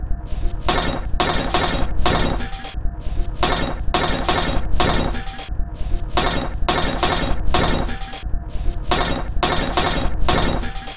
Instrument samples > Percussion
This 175bpm Drum Loop is good for composing Industrial/Electronic/Ambient songs or using as soundtrack to a sci-fi/suspense/horror indie game or short film.
Industrial, Packs, Loopable, Underground, Samples, Weird, Soundtrack, Alien, Drum, Dark, Ambient, Loop